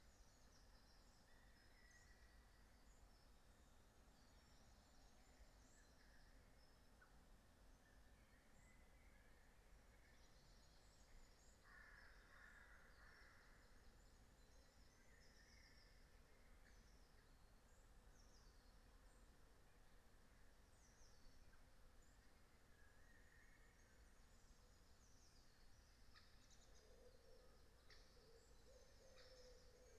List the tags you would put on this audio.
Soundscapes > Nature
phenological-recording
nature
raspberry-pi
sound-installation
data-to-sound
natural-soundscape

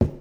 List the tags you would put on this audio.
Sound effects > Objects / House appliances
fill
knock
carry
clang
tip
clatter
scoop
lid
slam
plastic
metal
container
household
kitchen
tool
object
foley
shake
bucket
cleaning

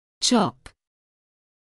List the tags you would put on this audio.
Speech > Solo speech
english
pronunciation
voice
word

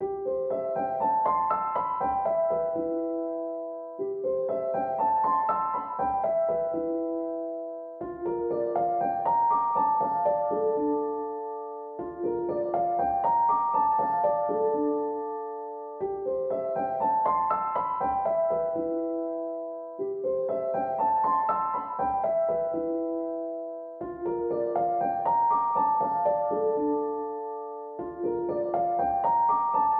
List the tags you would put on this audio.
Music > Solo instrument
music
simplesamples
samples
reverb

